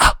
Speech > Solo speech
Very short exhaled voice.
exhalation, male